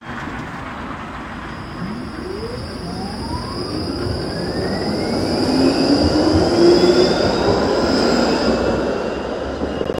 Sound effects > Vehicles
Tram sound
Tram arrival and departure sequences including door chimes and wheel squeal. Wet city acoustics with light rain and passing cars. Recorded at Sammonaukio (17:00-18:00) using iPhone 15 Pro onboard mics. No post-processing applied.